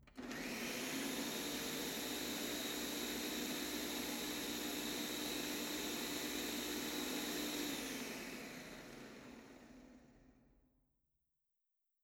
Sound effects > Objects / House appliances
MACHAppl-Distant Blender Turn On, Run, Off Nicholas Judy TDC
A blender turning on, running and turning off in distance.
ambience, background, blender, distance, home, house, run, turn-off, turn-on